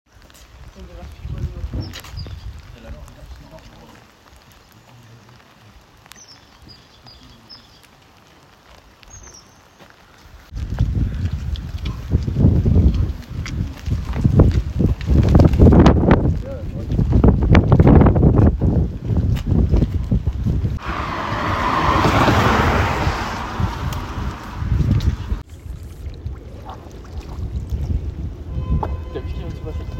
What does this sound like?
Soundscapes > Nature
bruit naturel et anthropique dans les calanques Natural (bird, wind) and anthropic noises (car slipping, horn)